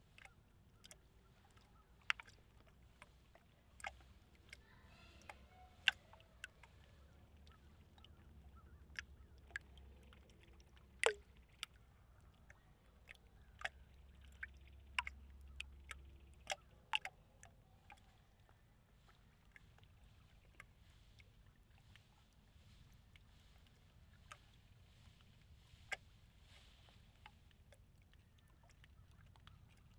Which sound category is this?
Soundscapes > Nature